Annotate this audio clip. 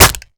Sound effects > Objects / House appliances
Stumping soda can crush mixed
Subject : Stumping a soda-can flat. They were the tall 33cl cola kind. Date YMD : 2025 July 20 Location : Albi 81000 Tarn Occitanie France. Sennheiser MKE600 P48, no filter. Weather : Processing : Layered 7 soda can crushes, applied a limiter to them to make them louder. Fade in/out. Using Audacity. Notes : Recorded in my basement.
33cl, 33cl-tall, aluminium, aluminium-can, Can, compacting, crumple, crushing, empty, fast, fast-crush, flat, FR-AV2, layered, metal, metallic, mixed, MKE600, processed, Sennheiser, Soda, Soda-can, Sodacan, stepping, stomp, Stump, Stumping, tall, Tascam